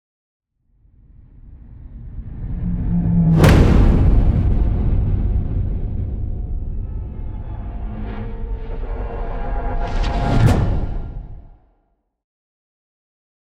Sound effects > Other
Sound Design Elements SFX PS 076
Effects recorded from the field.
bass, boom, cinematic, deep, effect, epic, explosion, game, hit, impact, implosion, indent, industrial, metal, movement, reveal, riser, stinger, sub, sweep, tension, trailer, transition, video, whoosh